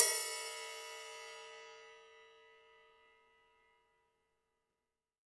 Music > Solo instrument
Cymbal hit with knife-012
Crash, Custom, Cymbal, Drums, FX, GONG, Hat, Metal, Paiste, Perc, Percussion, Ride, Sabian